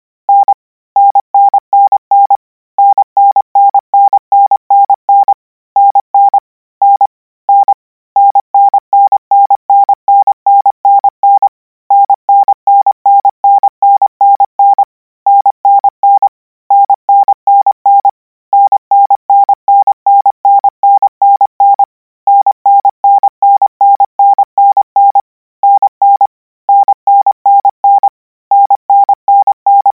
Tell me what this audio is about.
Sound effects > Electronic / Design
Koch 14 N - 200 N 25WPM 800Hz 90%
Practice hear letter 'N' use Koch method (practice each letter, symbol, letter separate than combine), 200 word random length, 25 word/minute, 800 Hz, 90% volume.
radio, morse, codigo, code, letters